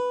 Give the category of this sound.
Instrument samples > String